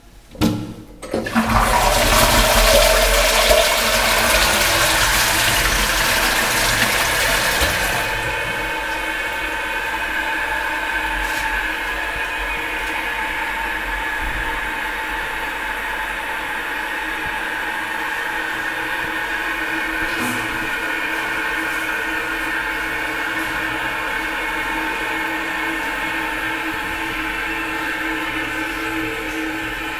Sound effects > Objects / House appliances
Roca toilet flush filling and vibrating at the end 2
Roca flush filling (recorded 2025)